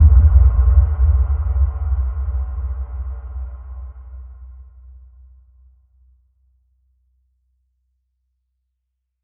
Sound effects > Electronic / Design
DEEP BURIED BOOM
DEEP, FUNK, RUMBLING, LOW, IMPACT, RATTLING, BRASIL, BRAZIL, MANDELAO, BRASILEIRO, EXPLOSION, BOOM, PROIBIDAO, HIT, BASSY, BRAZILIAN, BOLHA